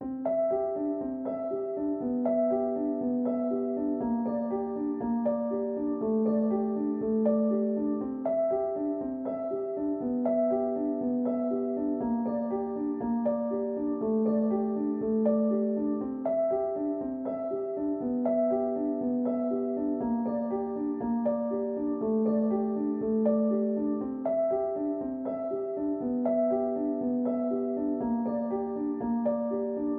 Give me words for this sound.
Music > Solo instrument
Piano loops 187 octave down long loop 120 bpm
120bpm, loop, 120, free, samples, pianomusic